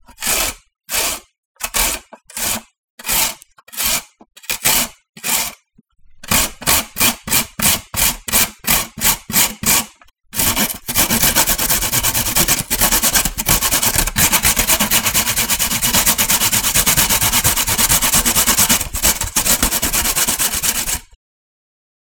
Sound effects > Objects / House appliances
Carrot being grated at three different speeds - slow, medium and fast. Recorded with Zoom H6 and SGH-6 Shotgun mic capsule.